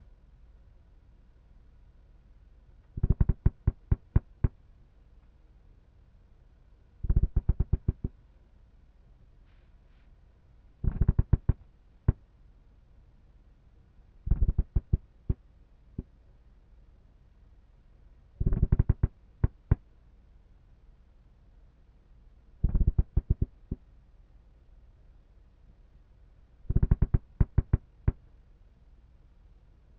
Sound effects > Other
floor creak 1
Some floor creaks to practice my recording and editing skills on. Recorded on a Shure MV6 microphone.
creaking, floor, floorboard, wood